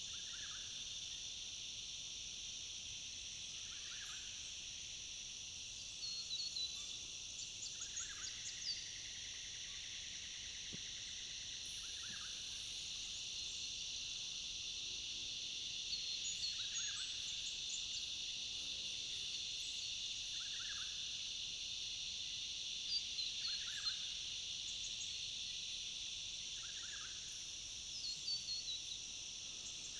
Soundscapes > Nature
Australian Bush Morning - Birds, Insects - Watagans near Newcastle, NSW, Australia